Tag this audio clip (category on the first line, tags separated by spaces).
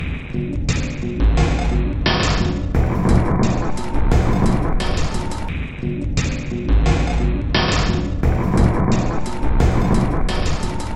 Instrument samples > Percussion
Drum Loopable Alien Dark Packs Industrial Weird Loop Samples Soundtrack Underground Ambient